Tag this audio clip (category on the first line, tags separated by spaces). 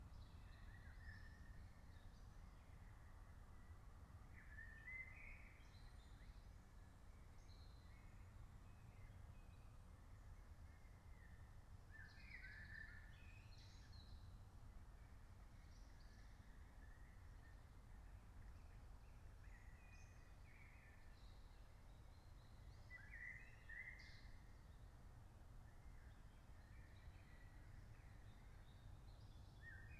Soundscapes > Nature
data-to-sound Dendrophone phenological-recording field-recording artistic-intervention weather-data natural-soundscape sound-installation raspberry-pi modified-soundscape alice-holt-forest nature soundscape